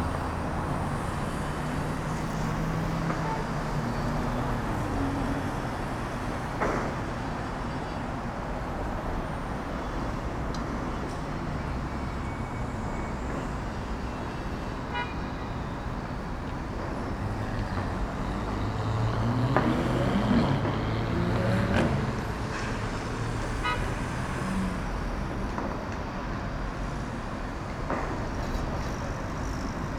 Soundscapes > Urban
A high-elevation daytime city ambience recorded from the 12th-floor balcony in Batumi. Features dense urban traffic with buses, scooters, and continuous car movement. Bright, energetic, modern city soundscape. If you’d like to support my work, you can get all my ambience recordings in one pack on a pay-what-you-want basis (starting from just $1). Your support helps me continue creating both free and commercial sound libraries! 🔹 What’s included?
balcony, Batumi, buses, cars, city, daytime, Georgia, noise, scooters, street, town, traffic, urban